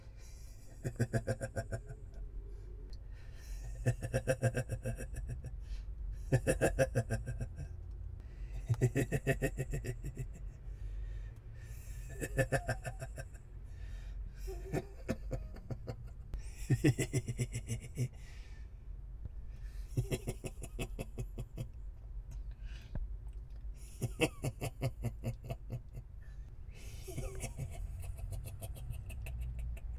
Speech > Solo speech
male evil giggle male collection
A short pack of giggles taken from inside a wardrobe for better insulation. Recorded with Zoom H2.